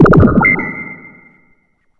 Instrument samples > Synths / Electronic
Benjolon 1 shot41
MODULAR, CHIRP, 1SHOT, BENJOLIN, SYNTH, DRUM, NOSIE